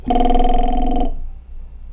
Sound effects > Objects / House appliances
Metal Beam Knife Plank Vibration Wobble SFX 2
ting, Vibration, Trippy, Clang, Klang, Wobble, Metal, FX, metallic, Beam, SFX, Perc, Foley, Vibrate, ding